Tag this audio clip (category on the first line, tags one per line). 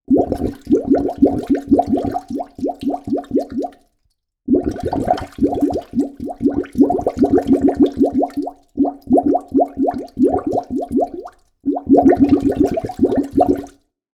Sound effects > Objects / House appliances
toilet
gurgle
water
bubbles